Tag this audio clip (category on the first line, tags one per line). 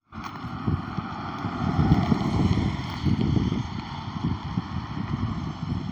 Sound effects > Vehicles
vehicle
car
drive